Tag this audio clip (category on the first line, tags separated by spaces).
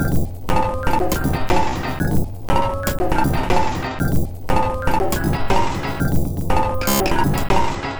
Instrument samples > Percussion
Dark Underground Ambient Packs Weird Loop Loopable Drum Soundtrack Alien Industrial Samples